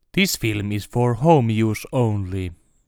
Speech > Solo speech
this film is for home use only calm
announcer, calm, human, male, man, voice